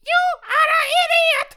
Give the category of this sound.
Speech > Solo speech